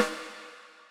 Music > Solo percussion
Snare Processed - Oneshot 126 - 14 by 6.5 inch Brass Ludwig
hits, realdrums, flam, rimshot, sfx, snaredrum, hit, oneshot, kit, beat, acoustic, drum, rimshots, percussion, roll, snare, crack, ludwig